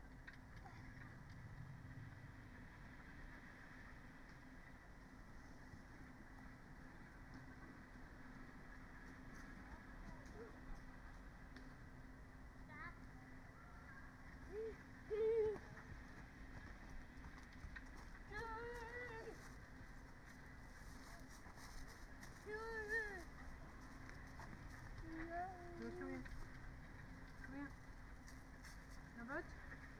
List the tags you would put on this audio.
Soundscapes > Nature
alice-holt-forest
artistic-intervention
data-to-sound
Dendrophone
modified-soundscape
natural-soundscape
nature
phenological-recording
raspberry-pi
soundscape
weather-data

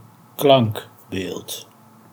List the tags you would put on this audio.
Speech > Solo speech
male
voice
words

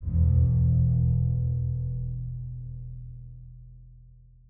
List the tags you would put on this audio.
Sound effects > Electronic / Design
BASS
IMPACTS
PUNCH
HITS
RUMBLE
RATTLING
IMPACT
BACKGROUND
BASSY
DEEP
BOOMY
HIT
RUMBLING
LOW

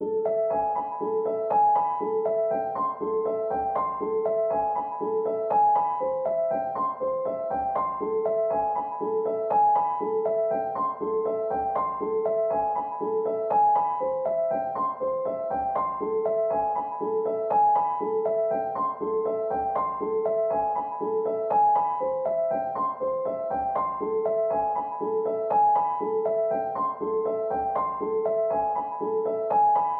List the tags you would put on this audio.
Music > Solo instrument
music
reverb
loop
simple
simplesamples
piano
pianomusic
samples
free
120bpm
120

